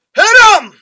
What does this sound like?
Speech > Solo speech
Soldier Yelling Hit em
Soldier Yelling a Command